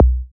Instrument samples > Percussion
Bigroom-Spring Kick 1
Synthed with bong vst by xoxo from plugin4. Processed with ZL EQ, Khs cliper, Waveshaper, Fruity limiter.